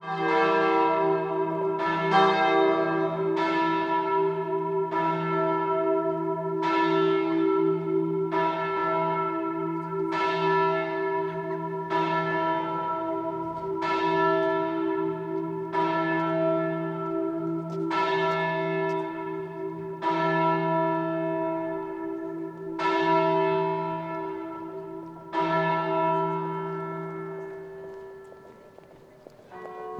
Soundscapes > Urban
A morning recording of the bells being rung at Lichfield Cathedral.
ringing, church, bells, church-bells, outdoors, cathedral